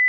Sound effects > Electronic / Design
Digital Beep
A simple sine-wave beep made in Audacity. If you want more specifications, then here's how I made it. 1. Open Audacity. 2. Go to Generate->Tone. 3. Set Waveform to Sine, Frequency to 2000, Amplitude to 0.2 & Duration to 1 second. 4. You generated this sound effect. I originally made this for a project but I found a better sound effect for it.